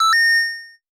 Sound effects > Electronic / Design
Designed coin pick up SFX created with Phaseplant and Vital.